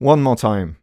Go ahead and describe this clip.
Speech > Solo speech
One more time 4
singletake, Male, hype, time, un-edited, Neumann, oneshot, one, chant, raw, Vocal, more, Single-take, Tascam, Man, FR-AV2, voice, U67, Mid-20s, dry